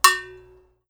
Music > Solo percussion
MUSCBell-Blue Snowball Microphone, CU Cowbell Ding Nicholas Judy TDC
A cowbell ding.
bell, Blue-brand, Blue-Snowball, cowbell, ding